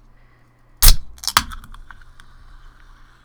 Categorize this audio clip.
Sound effects > Objects / House appliances